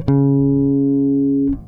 Music > Solo instrument
pick pluck click
bass, bassline, basslines, blues, chords, chuny, electric, electricbass, funk, fuzz, harmonic, harmonics, low, lowend, note, notes, pick, pluck, riff, riffs, rock, slap, slide, slides